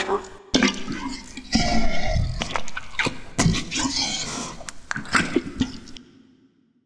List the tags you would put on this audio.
Sound effects > Experimental
Alien bite Creature demon devil dripping fx gross grotesque growl howl Monster mouth otherworldly Sfx snarl weird zombie

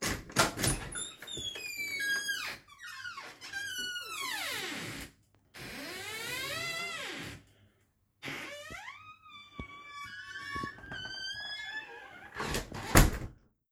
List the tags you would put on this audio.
Sound effects > Objects / House appliances
Phone-recording creak foley open squeak

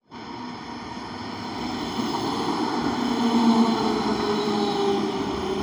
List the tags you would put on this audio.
Sound effects > Vehicles
drive tram vehicle

